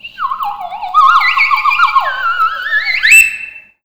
Sound effects > Objects / House appliances
TOONWhis-CU Slide Whistle Twirl, Reverberant Nicholas Judy TDC
A reverberant slide whistle twirl.
Blue-brand, Blue-Snowball, cartoon, reverberant, slide, slide-whistle, whistle